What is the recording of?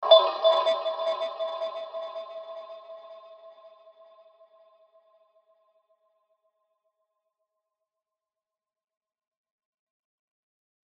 Sound effects > Electronic / Design
Reverbed Click
Synthesized click sound pitched down and processed with reverb and delay
Echo, Reverb, Synth